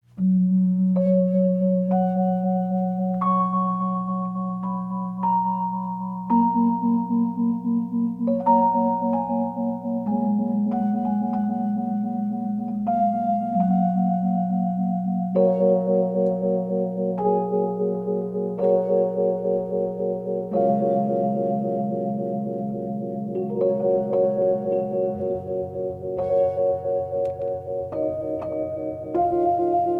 Music > Solo instrument
An improvisation I did on an old organ in a basement during a recording session for a friend's art project, circa 2008, I think.
organ, improvisation, ambient